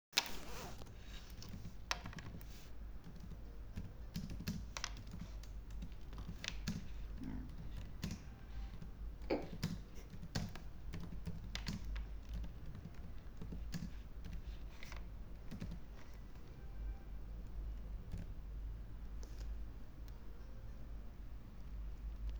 Sound effects > Other mechanisms, engines, machines
20250515 1807 keyboard phone microphone
atmophere, field, recording